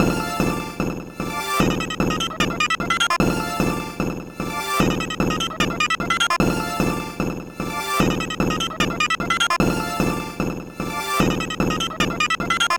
Instrument samples > Percussion
This 150bpm Drum Loop is good for composing Industrial/Electronic/Ambient songs or using as soundtrack to a sci-fi/suspense/horror indie game or short film.
Alien, Ambient, Dark, Industrial, Loop, Loopable, Samples